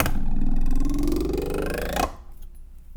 Sound effects > Objects / House appliances
knife and metal beam vibrations clicks dings and sfx-045
Beam, Clang, ding, Foley, FX, Klang, Metal, metallic, Perc, SFX, ting, Trippy, Vibrate, Vibration, Wobble